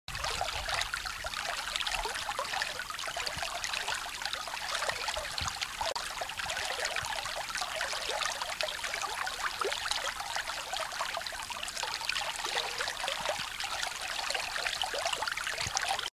Sound effects > Natural elements and explosions
Water babbling in a small creek
brook, nature, river, creek, water, stream